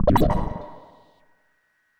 Instrument samples > Synths / Electronic
Benjolon 1 shot32
1SHOT, CHIRP, DRUM, MODULAR, NOISE, SYNTH